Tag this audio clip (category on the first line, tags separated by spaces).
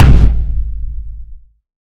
Instrument samples > Percussion
ngoma taboret tom tabla Sonor floortom tam-tam djembe drum talking-drum tambour ashiko bougarabou timpano talktom Premier talkdrum bata Tama bongo Pearl Ludwig kettledrum tom-tom dundun DW floor percussion Yamaha tenor-drum